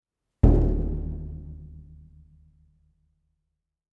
Music > Solo percussion
Shamanic drum single strike (64cm/26-inch)
Single strike using a mallet on a shamanic 64cm/26-inch drum.
26-inch, 64cm, drum, percussion, percussive, shamanic, skin, sound